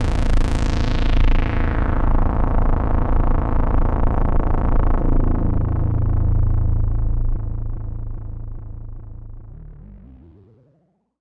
Sound effects > Experimental
Analog Bass, Sweeps, and FX-053
alien, analog, analogue, bass, basses, bassy, complex, dark, effect, electro, electronic, fx, korg, machine, mechanical, oneshot, pad, retro, robot, robotic, sample, sci-fi, scifi, sfx, snythesizer, sweep, synth, trippy, vintage, weird